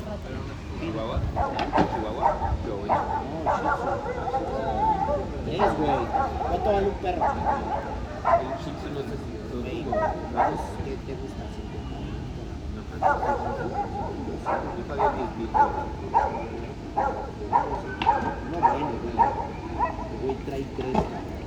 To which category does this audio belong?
Sound effects > Animals